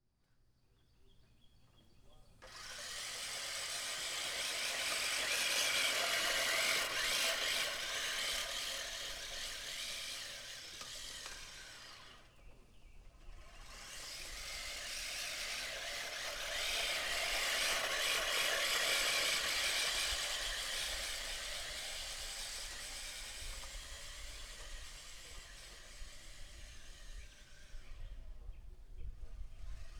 Soundscapes > Urban
Small, cheap, manual rotary mower making several passes on a small lawn. You hear the stereo sound of the mower going by several times. You can hear a bird chirping at the beginning, a car passing by at one point, then a neighbor's air conditioner starting up near the end. There's a bit of wind noise in the quieter sections. No EQ, Compression, or other processing was used. Please consider commenting and letting me know if you use this in a published work. I would like to hear it.